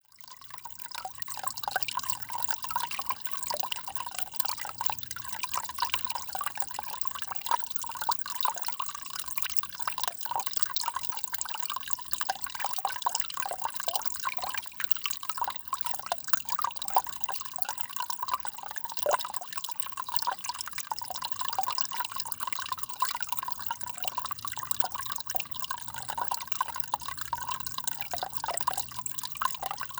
Soundscapes > Nature
Headwaters Trickling out of Ground under Redwood Tree in the Redwoods Forest
Nature recording in the redwoods using Tascam dr-05 field recorder